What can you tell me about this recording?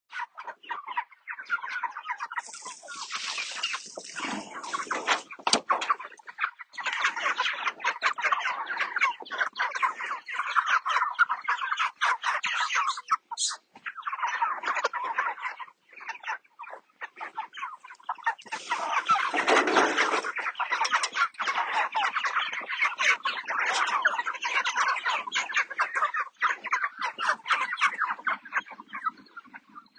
Soundscapes > Nature
Meat birds chicken feeding 06/21/2024
Feeding chickens meet birds
Feeding, farming, farm, country